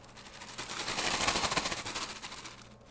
Sound effects > Animals
WINGBird-Blue Snowball Microphone, MCU Pigeon, Flap By, Simulated Nicholas Judy TDC
Pigeon wings flapping by. Simulated